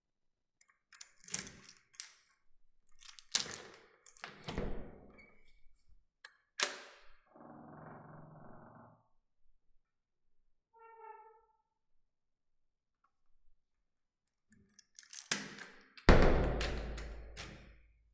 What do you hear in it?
Sound effects > Objects / House appliances
Attic door opening and closing 1
Our attic door (made of metal) opening and closing
attic, closing, door, doors, lock, opening